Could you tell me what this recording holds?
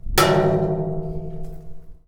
Sound effects > Objects / House appliances
Junkyard Foley and FX Percs (Metal, Clanks, Scrapes, Bangs, Scrap, and Machines) 41
tube, Foley, Bang, Robot, Ambience, dumpster, Machine, SFX, scrape, Smash, Clang, Atmosphere, FX, trash, Robotic, rattle, garbage, waste, Clank, Metal, Dump, Metallic, dumping, Percussion, rubbish, Bash, Environment